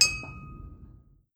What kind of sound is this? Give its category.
Sound effects > Objects / House appliances